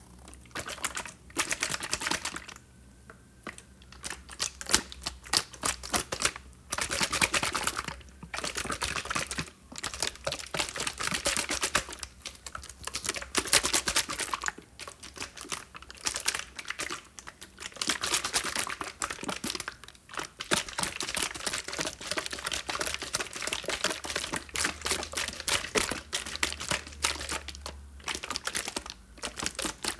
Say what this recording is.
Sound effects > Experimental
GOREOoze liquid stir container juicy crush ECG FCS2
There was a little left in a juice carton and when I stirred it it sounded like guts or something very gory.
container, crush, juicy, liquid, stir